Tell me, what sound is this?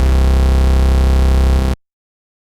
Instrument samples > Synths / Electronic
VSTi Elektrostudio (Micromoon+Model Pro)
vst, vsti, synth, bass